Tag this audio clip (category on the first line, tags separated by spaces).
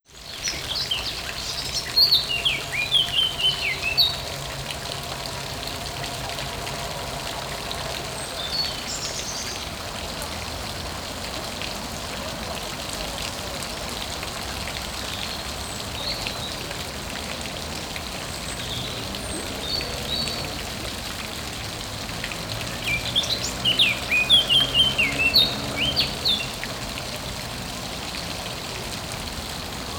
Soundscapes > Nature
ambience birds fountain